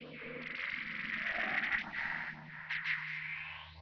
Soundscapes > Synthetic / Artificial
LFO Birdsong 18
Lfo, massive, birds